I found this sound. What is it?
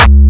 Instrument samples > Percussion
Classic Crispy Kick 1-C#
powerful, distorted, Kick, brazilianfunk, powerkick, crispy